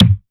Instrument samples > Percussion
Hyperrealism V9 Kick
synthetic drums processed to sound naturalistic
drums, bassdrum, sample, stereo, drum, machine, kickdrum, digital, one-shot, bass, kick